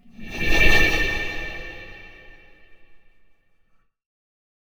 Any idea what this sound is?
Sound effects > Electronic / Design
Heavily edited and processed foley samples originated from an odd source - scratching dish plates together!
creepy, hollow, horror, riser